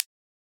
Sound effects > Objects / House appliances
Candle Burn 1 Perc
Sample from lighting a beeswax candle with a short wick, recorded with an AKG C414 XLII microphone.